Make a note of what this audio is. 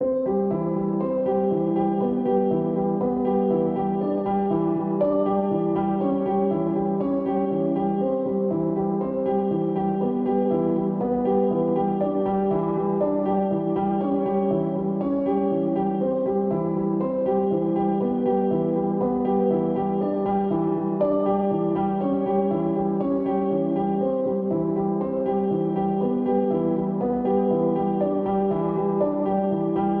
Music > Solo instrument
Piano loops 031 efect 4 octave long loop 120 bpm
120, 120bpm, free, loop, music, piano, pianomusic, reverb, samples, simple, simplesamples